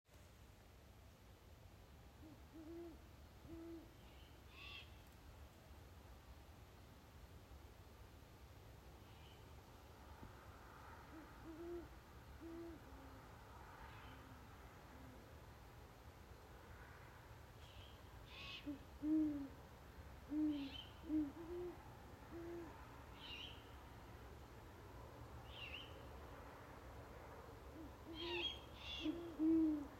Soundscapes > Nature
Owls in neighborhood 08/28/2023
Sound of owls
night, field-recording, owls, nature